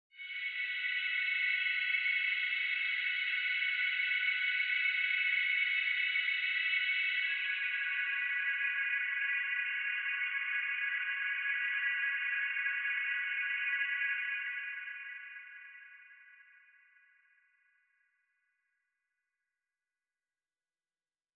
Soundscapes > Synthetic / Artificial
A portion of a melody loop composed by myself, processed through Fruity Granulizer, with the phase/frequency/reverb drastically altered for a pad-like aesthetic. Suitable for sequences that are dream-like or melancholic.